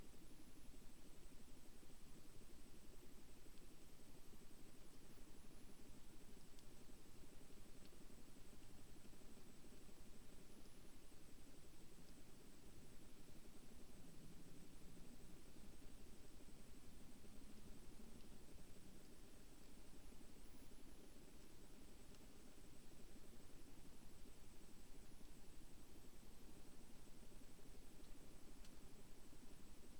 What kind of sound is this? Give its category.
Soundscapes > Nature